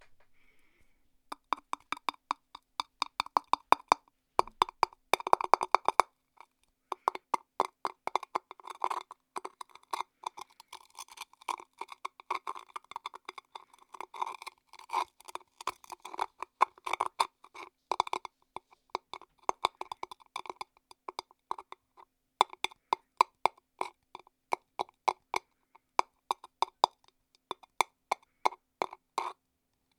Sound effects > Human sounds and actions
Scratching and tapping Hollow Ice
I tapped and scratched a hollow ice block. I used this sound for a giant digging insect.
hollow, ice, insect, scratching, Tapping